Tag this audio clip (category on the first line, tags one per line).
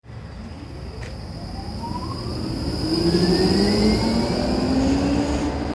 Soundscapes > Urban
streetcar
transport
tram